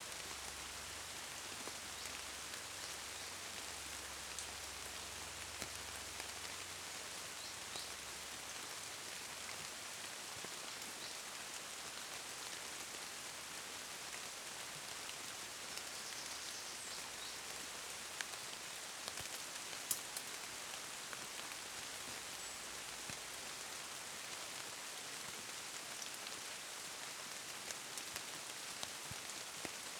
Soundscapes > Nature
pluie sous arbres Villy
Moderate rain recorded with microphones sheltered under the leaves of a tree. Drops of rain hitting the leaves around. Birds in the background.
birds, crachin, dripping, drizzle, drops, field-recording, gutter, pluie, rain, trees, water